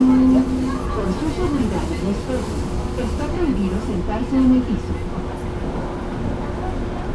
Soundscapes > Urban
Announcement to passengers that sitting on the floor on the train is not permitted. This is the light urban train system in Guadalajara, México.
soundscape, urban, Announcement, field-recording, train, Guadalajara